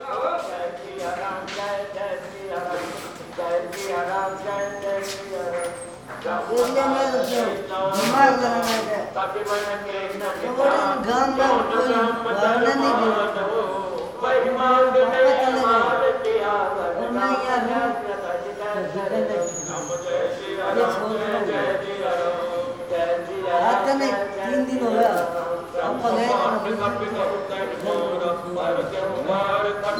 Soundscapes > Urban
Loud India (Gita Bhavan Ashram)
Temple, bells, Hinduism, Yoga, Hindu